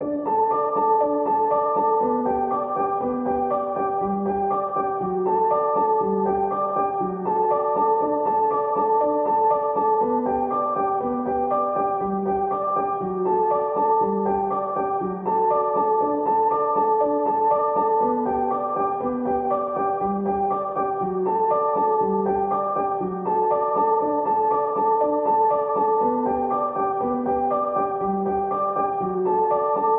Music > Solo instrument
Piano loops 168 efect 4 octave long loop 120 bpm
Beautiful piano music . VST/instruments used . This sound can be combined with other sounds in the pack. Otherwise, it is well usable up to 4/4 120 bpm.
pianomusic, free, 120, simple, music, simplesamples, 120bpm, piano, loop, samples, reverb